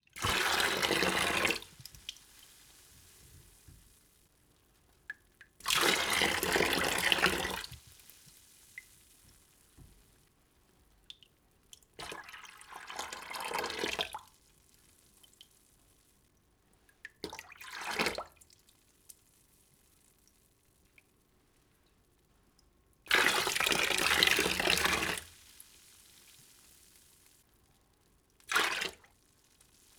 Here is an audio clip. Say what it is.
Sound effects > Natural elements and explosions
Water. Splash. Drip. Drop
Drip, Drop, Splash, Water